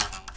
Sound effects > Other mechanisms, engines, machines
metal shop foley -141
little, rustle, thud, shop, metal, oneshot, tools, fx, wood